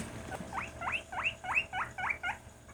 Sound effects > Animals
Rodents - American Guinea Pig, Squealing, Close Perspective
My pet guinea pig squeals in hunger. Recorded with an LG Stylus 2022.